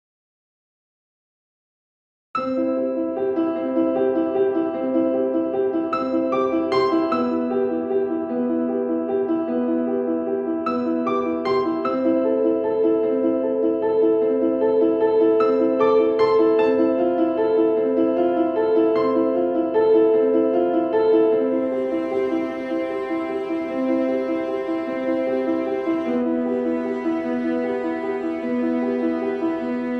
Music > Multiple instruments
A bittersweet melody perfect for emotional scenes in a film or video. Created with Cubase Elements 13 using stock instruments. Virtual instrument used: Halion Sonic Composed and produced by Vasco Miguel Cuadrado, January 21, 2025